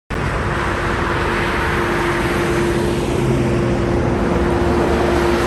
Sound effects > Vehicles
Sun Dec 21 2025 (16)
car, highway, road